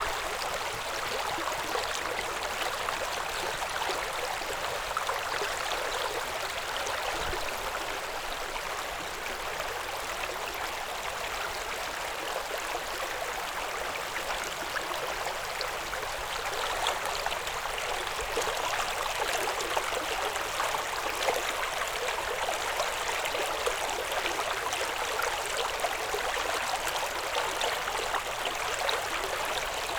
Soundscapes > Nature
FX River 02
Recorded at Jacobsburg State Park in PA.
creek, brook, babbling, stream, water, river